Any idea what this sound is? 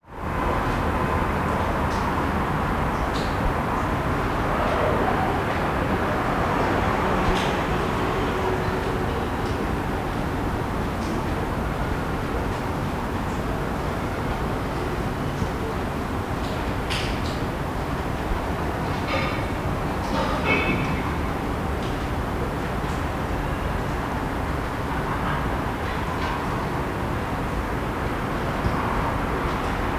Soundscapes > Urban
urban-backyard-water-dripping-cars-mumbles
ambience recording from a backyard in hamburg in summer with muffled sounds of life around and some water dripping from a roof.
ambience, street